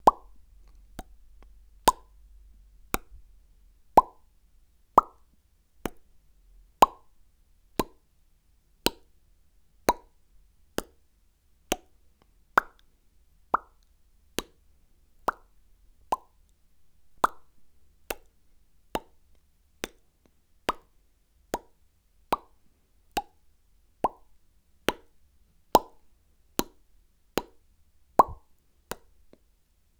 Sound effects > Human sounds and actions
Pops (Bubbles)
A few pop sounds made with my lips going "pop" Recorded with a 1st Generation DJI Mic and Processed with ocenAudio
bubble, bubbles, mouth, plop, pop, popping, pops, sfx